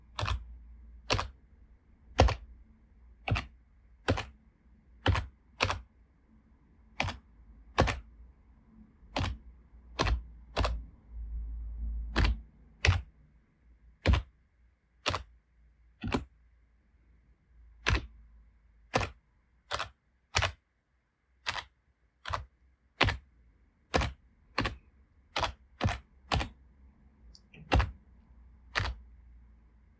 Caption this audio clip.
Sound effects > Other mechanisms, engines, machines
The sound of slow typing on a membrane keyboard. Recorded with an iPhone 13.
computer, keyboard, typing, key, keystroke, type, tapping, PC, press